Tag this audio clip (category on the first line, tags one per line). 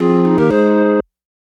Music > Other
bump
bumper
chime
effect
game
jingle
motif
sfx
sound-design
sounddesign
soundeffect
sound-logo
stinger
synth
ui